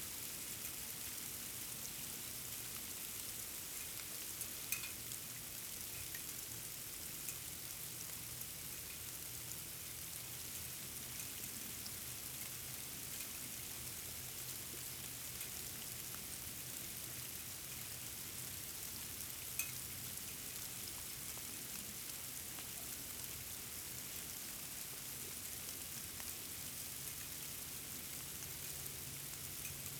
Soundscapes > Urban
Freezing rain over snow
Five minutes of freezing rain falling on top of 4-6 inches of snow recorded from under a metal handrail on a front porch. Impact sounds of freezing rain hitting snow and handrail. A few direct impacts. Faint helicopter sounds at the very beginning and end. Audio taken on Sunday, January 25, 2026 on day two of a winter storm in Frankfort, Kentucky, USA. Audio captured with a Zoom H2essential Handy Recorder, then +15 db in Adobe Audition.
field-recording, freezing-rain, snow, winter, winter-storm